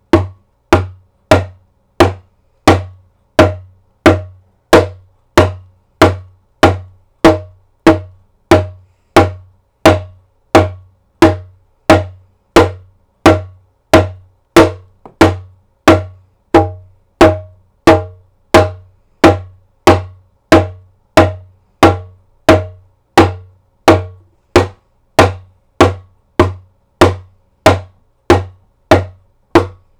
Music > Solo percussion
MUSCPerc-Blue Snowball Microphone, CU Djembe, Hits, Muted Nicholas Judy TDC
Muted djembe hits.